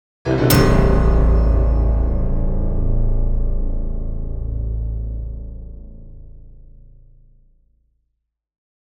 Music > Multiple instruments
Horror Sting (Harrowing String) 3
cinematic-stab, Gothic, horror-hit, horror-impact